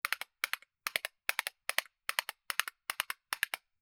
Instrument samples > Percussion
MusicalSpoon Large Sharp Gallop
Gallop, Hit, Horse, Minimal, Musical, Percussion, Slap, Spoon, Strike, Wood